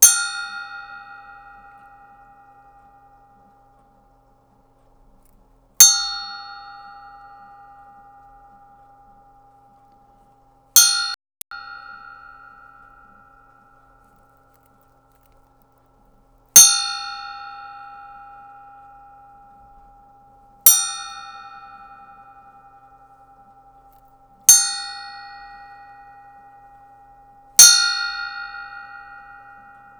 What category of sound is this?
Music > Solo percussion